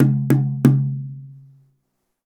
Music > Solo instrument
Toms Misc Perc Hits and Rhythms-008
Drums, Drum